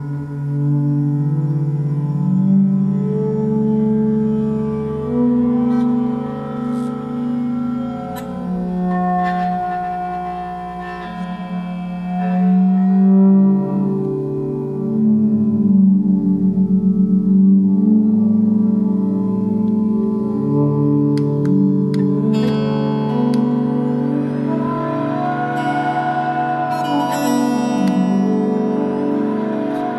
Instrument samples > String
A 12-string guitar being strummed, plucked, and resonated by a Landscape Ferrous. Formatted for use in the Make Noise Morphagene by Walker.